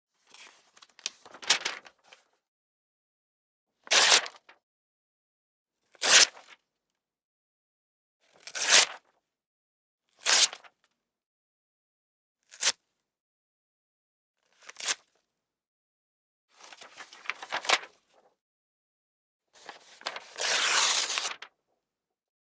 Sound effects > Objects / House appliances
Tearing up the paper 3
The sound of paper tearing. Recorded using Tascam Portacapture X8. Please write in the comments where you plan to use this sound. I think this sample deserves five stars in the rating ;-)
document, torn